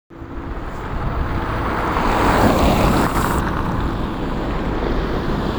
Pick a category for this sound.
Sound effects > Vehicles